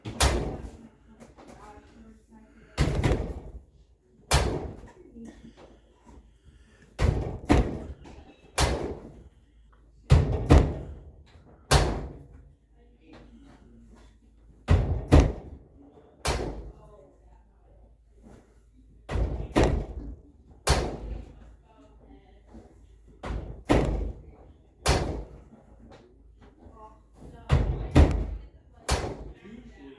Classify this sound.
Sound effects > Objects / House appliances